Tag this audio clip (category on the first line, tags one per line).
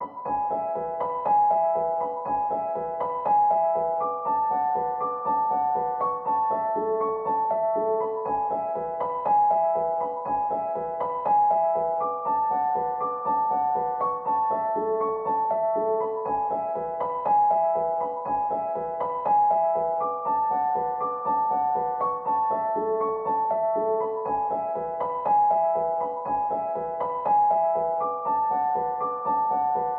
Music > Solo instrument
loop
simplesamples
reverb
simple
pianomusic
120
free
120bpm
samples
piano
music